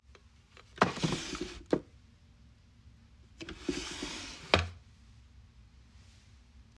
Sound effects > Objects / House appliances
Teak Nightstand Open and Close
A teak wood bedside nightstand is opened and closed.